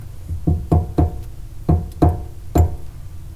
Sound effects > Objects / House appliances
industrial sink water foley-004
sfx, glass, bonk, industrial, mechanical, drill, foundobject, fx, metal, perc, oneshot, fieldrecording, foley, percussion, hit, natural, object, clunk, stab